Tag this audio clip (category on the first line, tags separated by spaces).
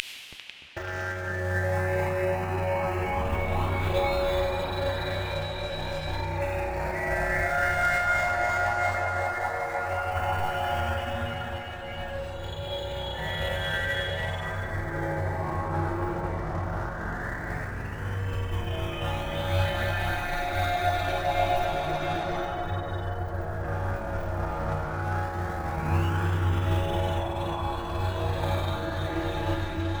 Soundscapes > Synthetic / Artificial
experimental slow shifting effect bass shimmer rumble roar shimmering drone ambient sfx wind texture synthetic low landscape ambience fx evolving atmosphere glitchy bassy long howl alien glitch dark